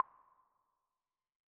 Sound effects > Electronic / Design
Made with the Vital synth in FL Studio — [SFX: Focus]. Designed for casual games.